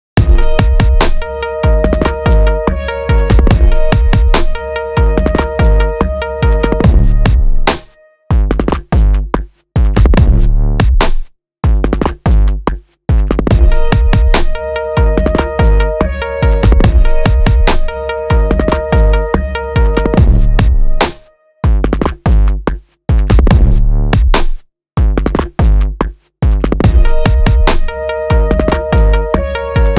Music > Multiple instruments
hip hop beat loop melody with bass
dark, chill, hop, hip, percussion, downtempo, melodic, bass, melody, hiphop, loop, beat